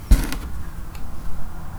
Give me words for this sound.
Sound effects > Other mechanisms, engines, machines
Woodshop Foley-053
fx, perc, strike, sound, oneshot, thud, sfx, tools, bop, knock, little, rustle, foley, tink